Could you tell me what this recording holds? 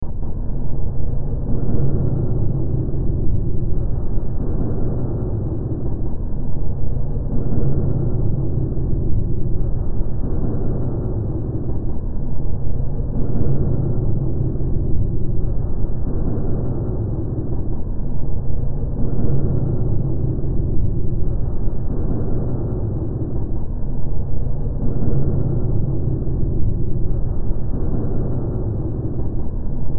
Soundscapes > Synthetic / Artificial
Looppelganger #149 | Dark Ambient Sound
Hill, Horror, Weird, Games, Gothic, Soundtrack, Underground, Ambience, Sci-fi, Drone, Noise, Darkness, Ambient, Survival, Silent